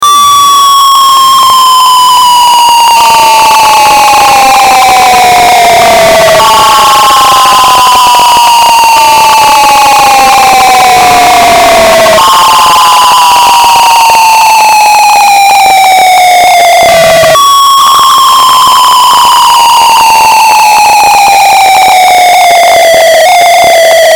Sound effects > Natural elements and explosions
Extremely Loud Noise 3
Loud, Edited, Firework